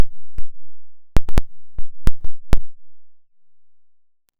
Sound effects > Electronic / Design
Optical Theremin 6 Osc dry-097
Alien, Analog, Bass, Digital, DIY, Dub, Electro, Electronic, Experimental, FX, Glitch, Glitchy, Infiltrator, Instrument, Noise, noisey, Optical, Otherworldly, Robot, Robotic, Sci-fi, Scifi, SFX, Spacey, Sweep, Synth, Theremin, Theremins, Trippy